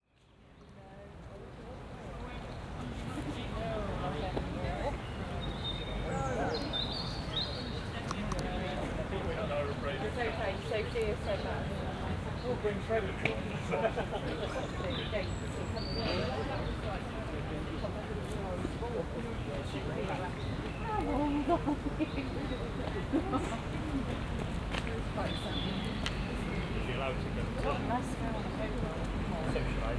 Soundscapes > Nature
Cardiff - Walk From Bute Park To Cardiff Castle
Cardiff, City, Citycentre, urban, fieldrecording